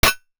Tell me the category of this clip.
Sound effects > Electronic / Design